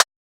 Instrument samples > Synths / Electronic
An IDM topper style percussion hit made in Surge XT, using FM synthesis.
electronic, fm, surge, synthetic